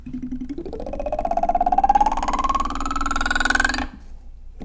Sound effects > Objects / House appliances
knife and metal beam vibrations clicks dings and sfx-031
Klang, ting, Wobble, Clang, FX, Metal, Trippy, SFX, ding, Vibration, metallic, Perc, Vibrate, Foley, Beam